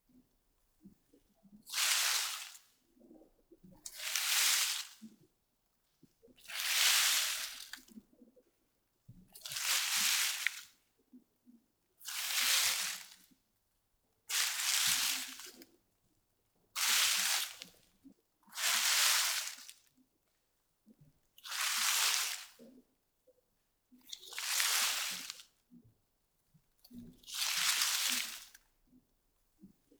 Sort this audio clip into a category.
Sound effects > Natural elements and explosions